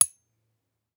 Sound effects > Other mechanisms, engines, machines
Metal Hit 02
metal, noise, sample